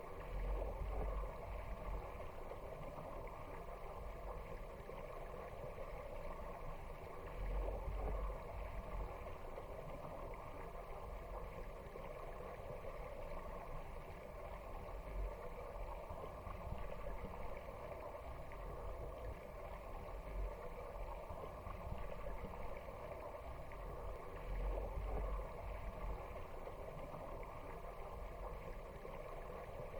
Soundscapes > Nature
Long recording of a waterfall , recorded with a home made hydrophone.